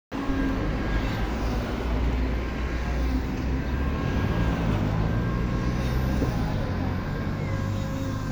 Sound effects > Other mechanisms, engines, machines
20250513 0934 dril phone microphone
field, atmophere, recording